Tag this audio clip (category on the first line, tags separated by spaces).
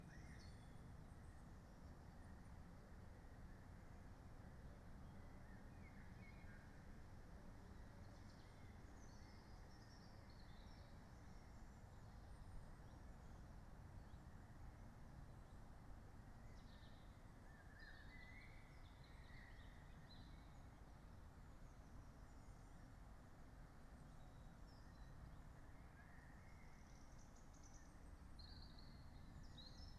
Soundscapes > Nature
artistic-intervention raspberry-pi alice-holt-forest